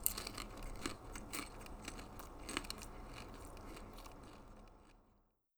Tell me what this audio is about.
Sound effects > Human sounds and actions
FOODEat-Blue Snowball Microphone Cheetos Popcorn Nicholas Judy TDC
Someone eating cheetos popcorn.